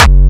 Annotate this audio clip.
Instrument samples > Percussion
Classic Crispy Kick 1-F#
Kick, powerkick, powerful, brazilianfunk, crispy, distorted